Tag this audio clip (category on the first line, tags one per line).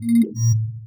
Sound effects > Electronic / Design
Digital
Interface
button
notification
message
UI
options